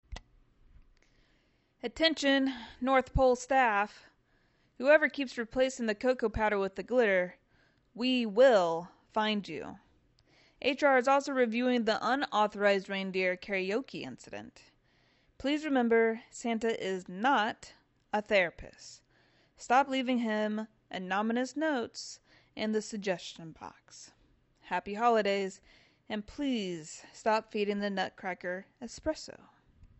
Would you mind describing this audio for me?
Speech > Solo speech
“Elf HR Memo” (comedy / character voice / workplace holiday)
A silly, chaotic holiday office memo from Elf HR. Great for character reads or fun social audio. Attention North Pole staff: Whoever keeps replacing the cocoa powder with glitter—we will find you. HR is also reviewing the unauthorized reindeer karaoke incident. Please remember: Santa is not a therapist. Stop leaving him anonymous notes in the suggestion box. Happy holidays. And please stop feeding the Nutcracker espresso.
elfvoice, holidaycomedy, ministory, script